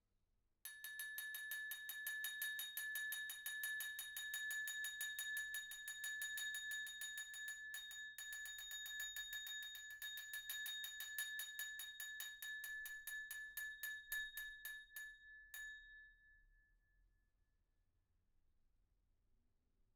Sound effects > Other
Glass applause 1
Tascam, individual, wine-glass, Rode, indoor, FR-AV2, clinging, XY, glass, cling